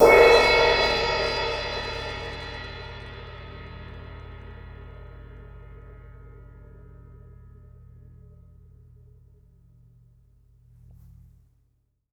Music > Solo instrument
Sabian 15 inch Custom Crash-4
15inch, Crash, Custom, Cymbal, Drums, Kit, Metal, Oneshot, Perc, Percussion